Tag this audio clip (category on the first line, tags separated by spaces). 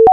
Sound effects > Electronic / Design
radio sine-wave electronic